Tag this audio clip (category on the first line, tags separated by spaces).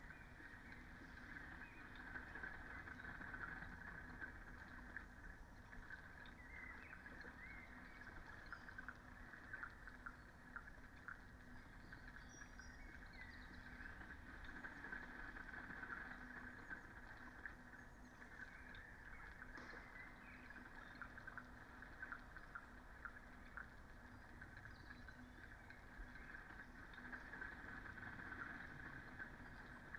Soundscapes > Nature
alice-holt-forest artistic-intervention data-to-sound Dendrophone field-recording modified-soundscape natural-soundscape nature phenological-recording raspberry-pi sound-installation soundscape weather-data